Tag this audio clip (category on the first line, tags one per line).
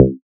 Instrument samples > Synths / Electronic
bass
fm-synthesis